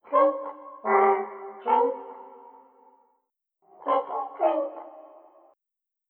Speech > Processed / Synthetic
Recorded "Trick or treat" and distorted with different effects.